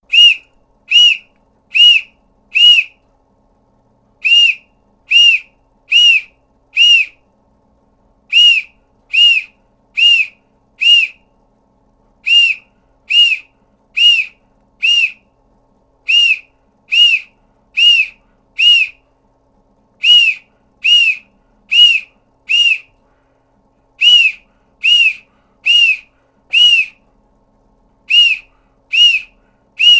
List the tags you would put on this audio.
Sound effects > Objects / House appliances
bosun
whistle
pipe
side-boys
boatswains-call
Blue-Snowball
Blue-brand

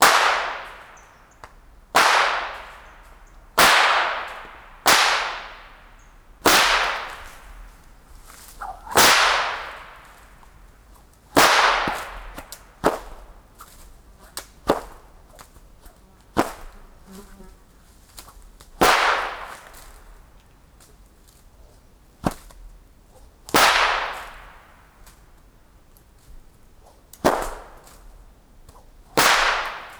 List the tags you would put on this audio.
Sound effects > Natural elements and explosions
Crack
Echo
Education
Forest
Physics
SFX
Whip

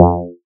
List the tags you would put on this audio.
Instrument samples > Synths / Electronic
fm-synthesis
bass
additive-synthesis

